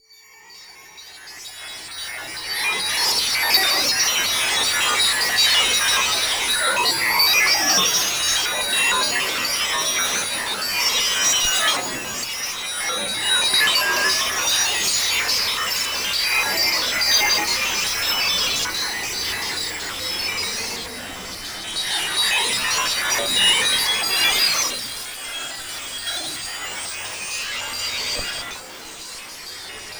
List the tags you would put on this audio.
Sound effects > Electronic / Design
ambient haunting cinematic noise-ambient abstract tension noise

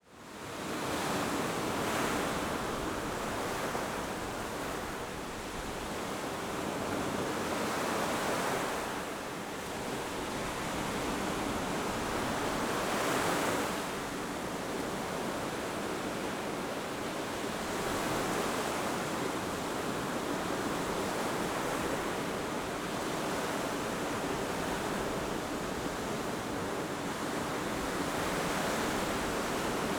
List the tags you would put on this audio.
Soundscapes > Nature
atmospheric long-shot rough sea

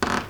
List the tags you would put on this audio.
Sound effects > Objects / House appliances
floorboards rub footstep floor squeaking heavy room wooden bare-foot walking old squeal groan old-building scrape hardwood screech squeaky creaking floorboard footsteps grate walk flooring weight going wood grind squeak creaky

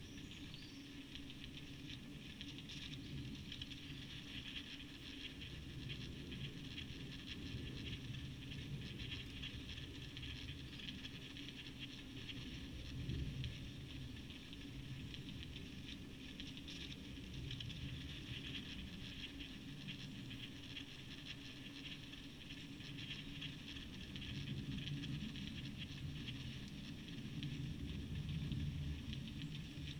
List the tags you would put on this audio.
Soundscapes > Nature
data-to-sound Dendrophone modified-soundscape

